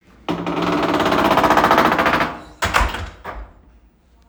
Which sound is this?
Sound effects > Objects / House appliances
Creaky Door in Dorm
Recorded on my iPhone 13, using voice memos. Creaky door in my dorm. Sounded cool so I got it.
Closing, Creak, Door, Sfx, Squeak